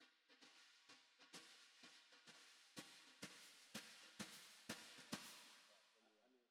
Music > Solo percussion
snare Processed - light beush beat - 14 by 6.5 inch Brass Ludwig
fx, drumkit, snaredrum, oneshot, hit, snare, realdrums, rimshots, acoustic, snareroll, reverb, brass, drum, flam, sfx, kit, processed, percussion, roll, realdrum, rim, ludwig, beat, crack, perc, snares, hits, rimshot, drums